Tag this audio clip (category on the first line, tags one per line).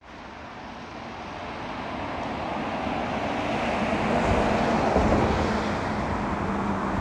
Soundscapes > Urban
bus
vehicle